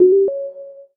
Sound effects > Electronic / Design
Sine Spooky
A sweet lil ringtone/chime, made in Ableton, processed in Pro Tools. I made this pack one afternoon, using a single sample of a Sine wave from Ableton's Operator, stretching out the waveform, and modulating the pitch transposition envelope.
bleep, effect, digital, spooky, game, beep, ringtone, processed, synth, motif, gui, blip, sine, ui, chirp, sfx, computer, click, electronic